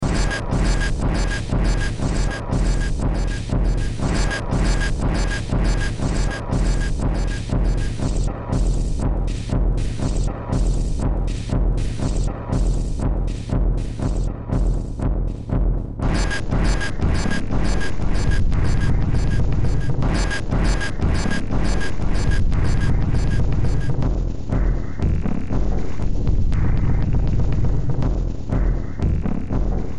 Music > Multiple instruments
Demo Track #3387 (Industraumatic)
Ambient, Underground, Horror, Sci-fi